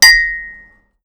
Sound effects > Objects / House appliances
FOODGware-Blue Snowball Microphone, CU Ceramic Mug Ding 04 Nicholas Judy TDC
A ceramic mug ding.
ding, mug, Blue-brand, ceramic, Blue-Snowball, foley